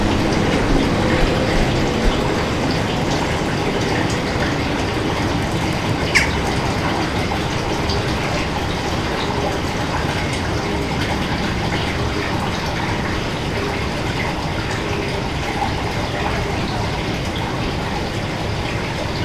Sound effects > Natural elements and explosions
250629 Albi Rue Rinaldi pontvieux - underground water - Mke600
Subject : Recording a closed Culvert Date YMD : 2025 June 29 Sunday Morning (07h30-08h30) Location : Albi 81000 Tarn Occitanie France. Sennheiser MKE600 with stock windcover P48, no filter. Weather : Sunny no wind/cloud. Processing : Trimmed in Audacity. Notes : There’s “Pause Guitare” being installed. So you may hear construction work in the background. Tips : With the handheld nature of it all. You may want to add a HPF even if only 30-40hz.
2025, closed, 81000, Sunday, Shotgun-mic, MKE600, Early-morning, June, underground, Albi, Occitanie, France, Outdoor, Hypercardioid, Morning, water, stream, Culvert, City, Tarn